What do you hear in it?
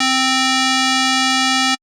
Instrument samples > Synths / Electronic
05. FM-X ODD2 SKIRT7 C3root
FM-X, MODX, Montage, Yamaha